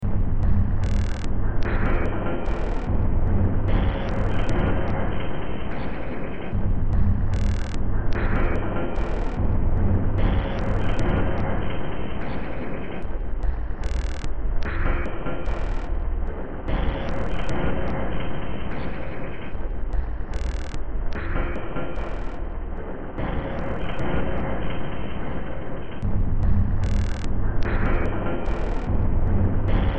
Music > Multiple instruments
Demo Track #3786 (Industraumatic)
Ambient, Cyberpunk, Games, Horror, Industrial, Noise, Sci-fi, Soundtrack, Underground